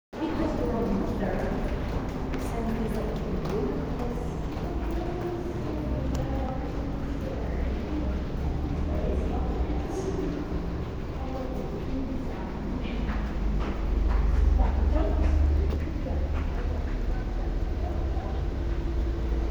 Soundscapes > Urban
20250516 1703 tunel phone microphone
atmophere, field, recording